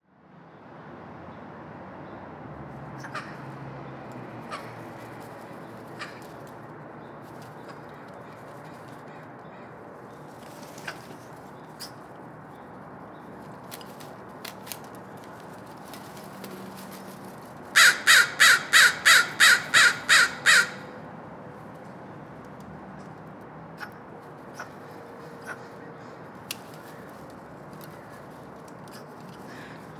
Soundscapes > Urban
Every morning I feed a group of crows in my neighborhood. Usually they are more vocal but they don't care for the microphone even though it is hidden inside. This is the crows swooping in and landing on the fire escape where the food is, you can also hear the little tinking when they pick something out of the bowl.
ambience, birds, caw, city, crows, field-recording, life, traffic, urban, wings